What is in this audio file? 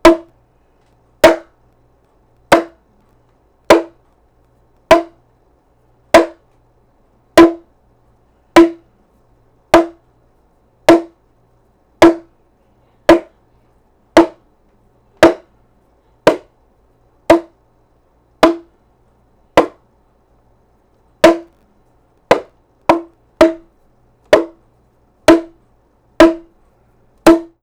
Music > Solo percussion
MUSCPerc-Blue Snowball Microphone, CU Bongo, Large, Hit Nicholas Judy TDC
A large bongo drum hit.
Blue-brand, bongo, drum, hit, Blue-Snowball, large